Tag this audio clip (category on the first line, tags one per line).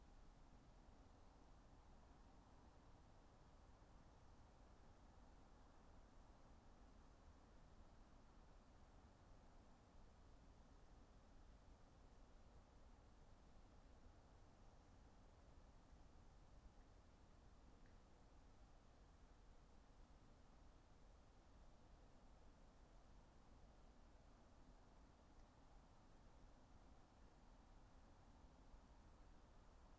Soundscapes > Nature
Dendrophone
phenological-recording
soundscape
data-to-sound
field-recording
alice-holt-forest
modified-soundscape
weather-data
artistic-intervention
sound-installation
nature
raspberry-pi
natural-soundscape